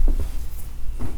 Sound effects > Objects / House appliances
knife and metal beam vibrations clicks dings and sfx-075
ding, FX, metallic, Clang, Klang, Metal, Perc, SFX, Beam, Foley, Vibrate, Vibration, Wobble, ting, Trippy